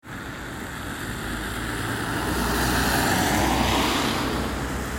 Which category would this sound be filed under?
Sound effects > Vehicles